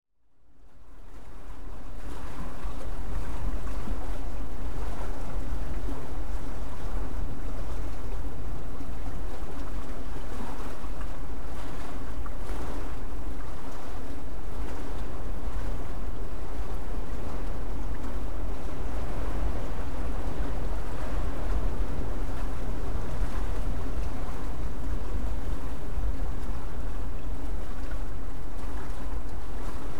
Soundscapes > Nature
A morning recording at Delph Reservoir, Bolton. Recording taken from Reservoir bank on the walkway. Tascam DR-05X. Stereo. 96Khs.
field-recording, lapping, reservoir, water, wind